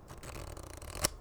Sound effects > Objects / House appliances
GAMEMisc-Blue Snowball Microphone Cards, Shuffle 07 Nicholas Judy TDC
Cards being shuffled.
foley, shuffle, cards, Blue-brand, Blue-Snowball